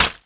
Sound effects > Electronic / Design
Matchstrike Fail-02
Matchstick being struck against a matchbox or rough surface unsuccessfully. Variation 2 of 4.
scratch, attempt, light, strike, matchstick, scrape, fire